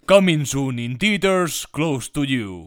Speech > Solo speech
Coming soon in theaters close to you
announcer, male, movies, theaters, trailer, voice